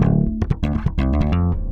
Music > Solo instrument
slap fill
electric, harmonic, lowend, notes, riff, riffs